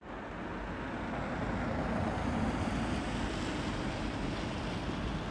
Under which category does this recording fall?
Soundscapes > Urban